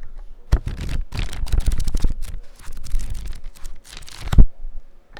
Sound effects > Other
Flipping through a book
Flipping through pages of a book fast.